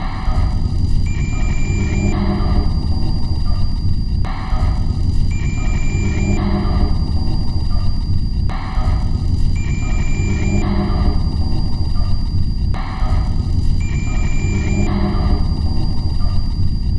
Soundscapes > Synthetic / Artificial
This 113bpm Ambient Loop is good for composing Industrial/Electronic/Ambient songs or using as soundtrack to a sci-fi/suspense/horror indie game or short film.
Weird, Soundtrack, Loopable, Ambient, Samples, Underground, Packs, Dark